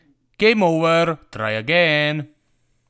Speech > Solo speech
game over, try again
videogame, male, voice, human, calm, videogames